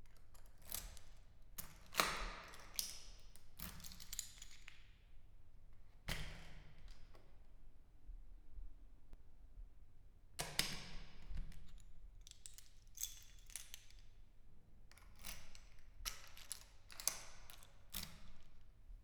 Sound effects > Objects / House appliances
Our apartment door opening and closing
doors, door, lock, closing, apartment, opening